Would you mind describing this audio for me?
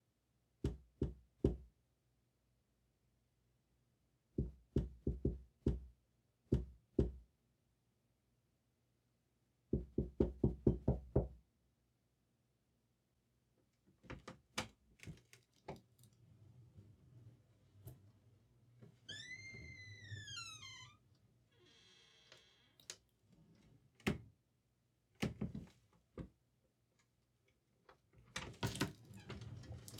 Sound effects > Human sounds and actions
Various Door Sounds - Knocking, Opening/Closing, Rattling
recorded on an audio technica at8035 as a test, just wanted to see how the directionality sounded from across the room- figured i'd throw it up here in case the sounds were of any use to anybody!